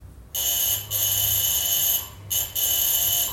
Sound effects > Objects / House appliances
Doorbell and its urgent
Slightly aggressive doorbell (rang 4 times in total) First: # 0:00.334 Second: # 0:00.894 Third: # 0:02.306 Forth: # 0:02.560